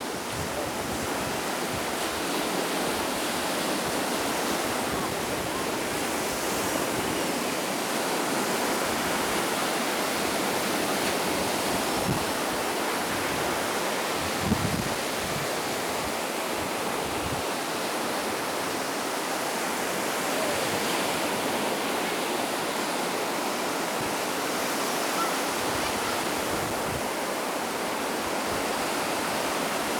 Soundscapes > Nature
This is the sound of me walking along Anna Maria Beach in Florida.
beach, field-recording, florida, people, water, waves